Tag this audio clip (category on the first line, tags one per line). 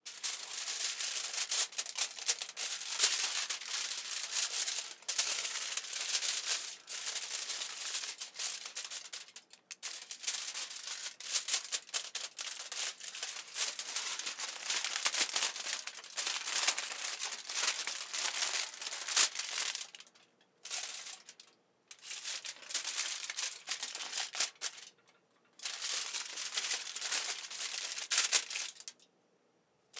Sound effects > Objects / House appliances
food
crinkle
almuminum
kitchen
foil
handling
prep
metal